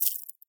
Sound effects > Objects / House appliances
Swooshing jewellery chains and necklaces in various thicknesses, recorded with an AKG C414 XLII microphone.